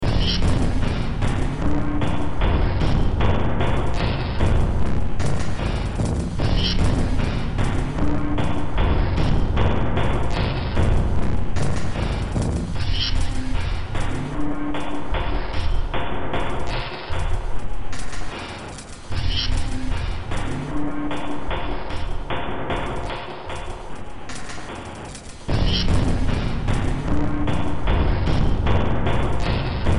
Music > Multiple instruments
Demo Track #3561 (Industraumatic)
Games, Horror, Noise